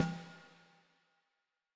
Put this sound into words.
Music > Solo percussion
Snare Processed - Oneshot 27 - 14 by 6.5 inch Brass Ludwig
roll, percussion, drums, hits, rimshots, snare, oneshot, fx, brass, flam, sfx, snaredrum, beat, rimshot, realdrums, processed, hit, realdrum, snares, snareroll, reverb, crack, acoustic, drum, kit, rim, ludwig, drumkit, perc